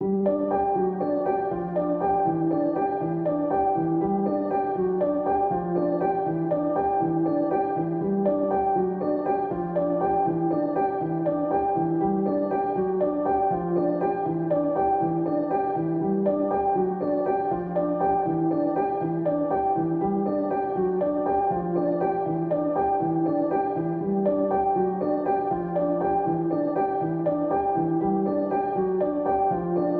Music > Solo instrument
Piano loops 153 efect 4 octave long loop 120 bpm
simple, music, piano, simplesamples